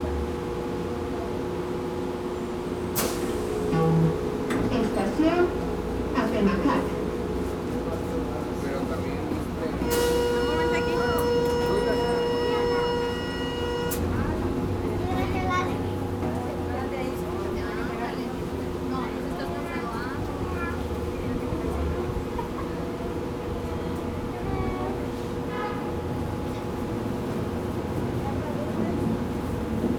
Soundscapes > Urban
Public address system announcing arrival to Atemajac train station in Guadalajara, México.
ambience, field-recording, Guadalajara, train, urban